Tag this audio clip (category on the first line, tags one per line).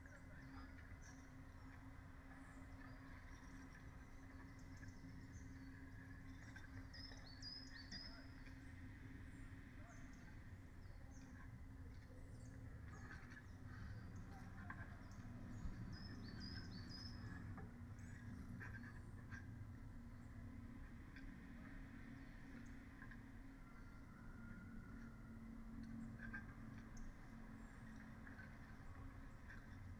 Soundscapes > Nature
phenological-recording
nature
Dendrophone
raspberry-pi
weather-data
data-to-sound
sound-installation
natural-soundscape
modified-soundscape
alice-holt-forest
artistic-intervention